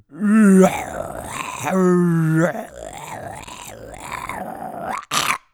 Sound effects > Animals
sick dog
dog, monster, sick, angry, animal